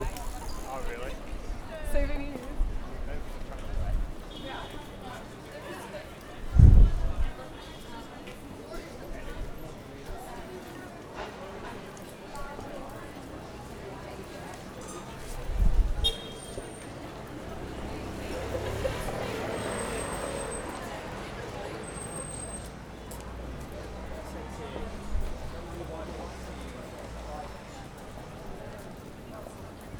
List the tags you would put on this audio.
Soundscapes > Urban
bustling city neighbourhood

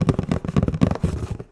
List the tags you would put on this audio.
Sound effects > Objects / House appliances
cleaning pail drop carry kitchen clatter garden hollow knock pour water plastic debris fill liquid household spill bucket lid metal tool handle container clang object foley tip slam shake scoop

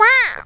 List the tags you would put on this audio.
Sound effects > Human sounds and actions
animal duck imitating male vocal voice

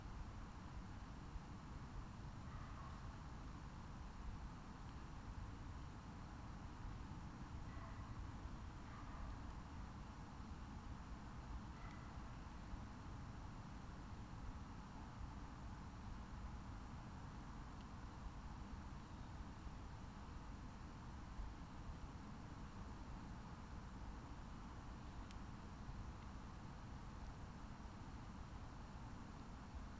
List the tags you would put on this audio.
Soundscapes > Nature
nature field-recording phenological-recording meadow raspberry-pi

